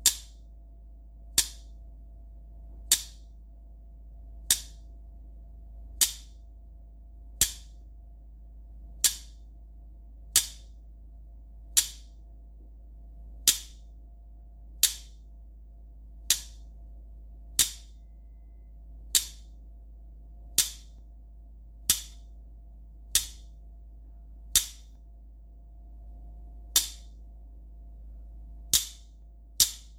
Sound effects > Objects / House appliances
FOODKware-Samsung Galaxy Smartphone Metal Tongs, Clack Nicholas Judy TDC
Metal tongs clacking.
clack, foley, metal, Phone-recording, tongs